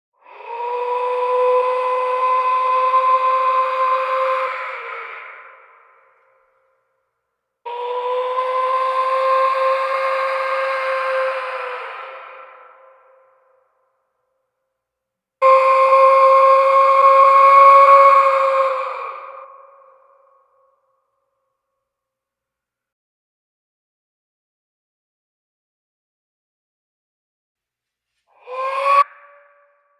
Instrument samples > Wind
Silbato de barro encontrado en zonas arqueológicas en México. (Réplica) Clay whistle found in archaeological sites in Mexico. (Replica)